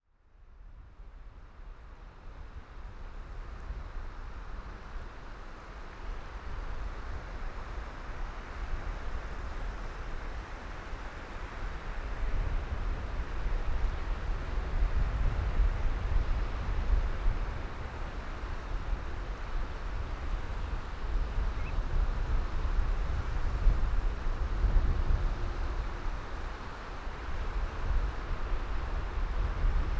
Soundscapes > Nature
A recording at the coast of Solway Firth estuary.
water, firth, estuary